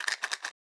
Sound effects > Objects / House appliances
Matchsticks ShakeBox 3 Shaker
matchstick-box, matchstick, shaker